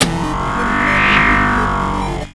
Sound effects > Other mechanisms, engines, machines
Laboratory Computer - Scan Specimen
A sound effect of a large device scanning a specimen.